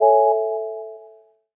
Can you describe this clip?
Sound effects > Electronic / Design
A delightful lil chime/ringtone, made on a Korg Microkorg S, edited and processed in Pro Tools.